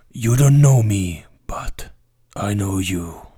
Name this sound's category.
Speech > Solo speech